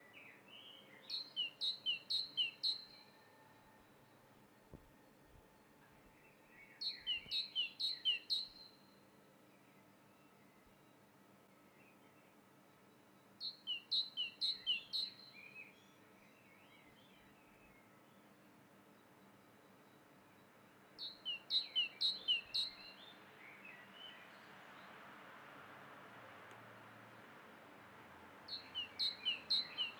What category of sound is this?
Soundscapes > Nature